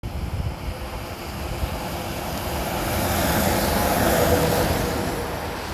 Soundscapes > Urban
Bus moving at 25 mph (1)
Bus moving at 25 miles per hour: Rusty sound of gravel on the road, revving engine, street background sound. Recorded with Samsung galaxy A33 voice recorder. The sound is not processed. Recorded on clear afternoon winter in the Tampere, Finland.
Bus, Street, Traffic